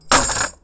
Music > Solo percussion
MUSCShake-Blue Snowball Microphone, CU Tambourine, Drop Nicholas Judy TDC
A tambourine drop.
Blue-brand, Blue-Snowball, drop, tambourine